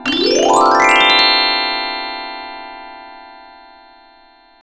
Sound effects > Experimental
Recorded and sampled from using LMMS with the Celesta patch from the ILIO Synclavier Percussion + Vol. 2 World and Orchestral CD library.